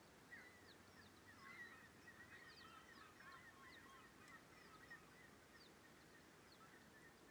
Soundscapes > Nature
Birds on the coast 4
Tascam DR-60 LOM Uši Pro (pair)
nature, coast, birds